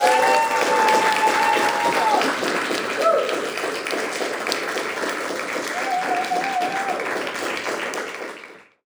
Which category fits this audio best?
Sound effects > Human sounds and actions